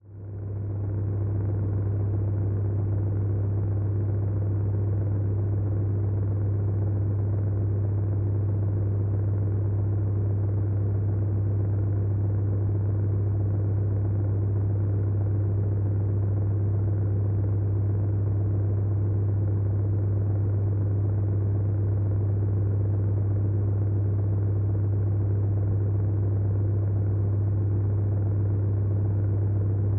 Sound effects > Other mechanisms, engines, machines
Recorded using a contact mic to a powered on household tower fan.
contact, fan, mic, rumble, vibrating, vibration